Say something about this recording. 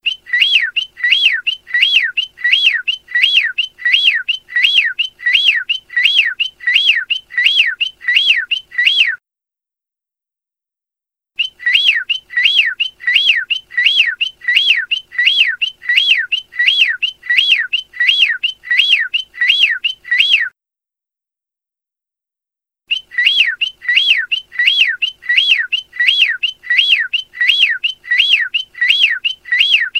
Sound effects > Animals
TOONAnml-Blue Snowball Microphone, CU Whipporwill Nicholas Judy TDC
A whippoorwill. Human imitation.